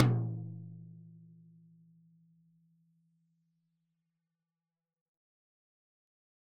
Music > Solo percussion
Med-low Tom - Oneshot 19 12 inch Sonor Force 3007 Maple Rack
wood, flam, acoustic, maple, perc, recording, loop, roll, beat, Medium-Tom, tomdrum, realdrum, drums, drum, drumkit, real, toms, med-tom, oneshot, kit, percussion, quality, Tom